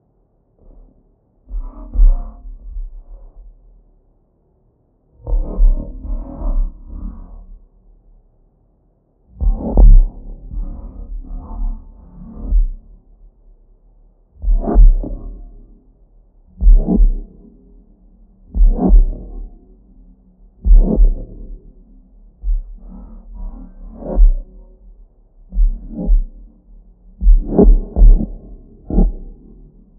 Sound effects > Experimental
Leather Swipes Low
Heavily processed recording of leather swiping, low frequency
recorded, techno, sample, leather, deep